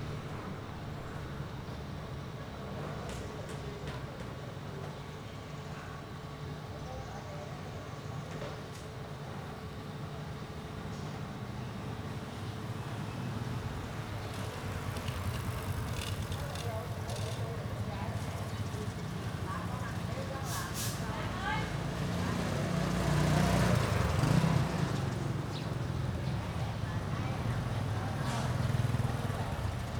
Soundscapes > Urban
Traffic Suburbs HoChiMinhCity Nov2014 NK
A recording from the suburbs of Ho Chi Minh City in Vietnam in November, 2014. Passing motorbikes and tuk-tuks can be heard, along with the sound of distant chatter and birdsong. Recorded on a Tascam DR-40. 16-bit PCM.
Motorbike, Birds, Engine, City, Asia, Suburbs, Tuk-Tuk, Ho-Chi-Minh, Vietnam, Traffic, Street, Ride